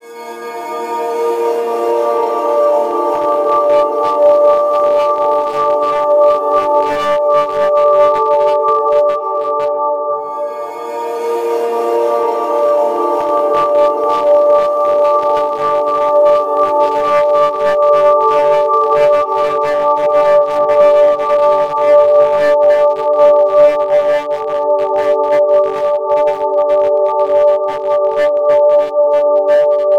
Music > Solo instrument
a pad I made along with the beat, meant to start before the beat, in the key of G Major, at 191 bpm, 4/4 time